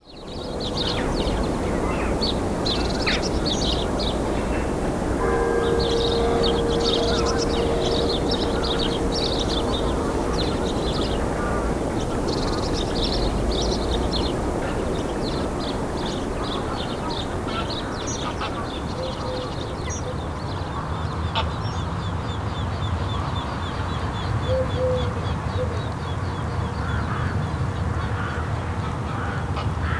Soundscapes > Nature
a year river and village - river Meuse and village Bokhoven in 12 months VER 2
My audio presentation is about the village Bokhoven and the Meuse river presented in 12 months from January to December in 12 nature tracks. This is about a beautiful area, a part of the fragile wetland delta from the Netherlands, and is only 100 km from the North-Sea coast. Here is where I have made hundreds of recordings the past 15 years. What I hope and wish is that our grandchildren's grandchildren will still be able to enjoy this, in the flesh, but that is up to us. explanatory list Here is my audio document of all the seasons over many years of Bokhoven and its surroundings.